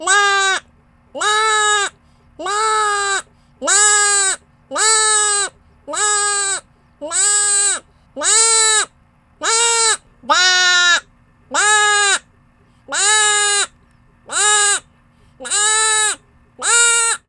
Sound effects > Animals
TOONAnml-Blue Snowball Microphone, CU Lamb Baa, Human Imitation, Cartoon, Sounds Real Nicholas Judy TDC
A lamb bleating. Human imitation. Cartoon. Sounds real.
human, Blue-brand, cartoon, bleat, lamb, imitation, Blue-Snowball, real